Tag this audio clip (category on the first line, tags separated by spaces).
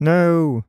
Speech > Solo speech
Tascam 2025 Shotgun-microphone dissapointed Generic-lines FR-AV2 mid-20s Sennheiser MKE-600 VA MKE600 Male Voice-acting Shotgun-mic no Calm Hypercardioid Adult Single-mic-mono july